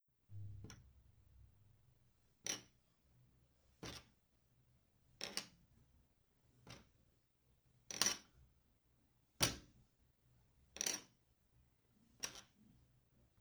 Sound effects > Objects / House appliances
picking up putting down pencil
Recordings of repeatedly picking up and putting down a wooden pencil off of and onto a hard tabletop.